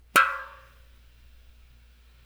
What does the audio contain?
Sound effects > Objects / House appliances
aluminum can foley-011
sfx, foley, household, tap, water, metal, alumminum, scrape, fx, can